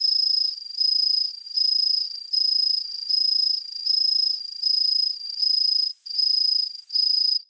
Soundscapes > Synthetic / Artificial
11 - Atmospheres & Ambiences - Crickets B
A field of synthesised crickets.
ambience, crickets, ambient, summer, cricket